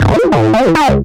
Instrument samples > Synths / Electronic
CVLT BASS 168
bass, bassdrop, clear, drops, lfo, low, lowend, stabs, sub, subbass, subs, subwoofer, synth, synthbass, wavetable, wobble